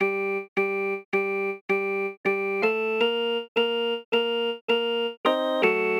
Music > Solo instrument
Money Makers Organ 2 - 80BPM G Minor
Chopped tonewheel organ melody sample in G harmonic minor at 80 BPM. Made using GeneralUser GS 1.472 in Reaper. Second of two parts.